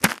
Sound effects > Human sounds and actions
Recorded on ZoomH1n and processed with Logic Pro.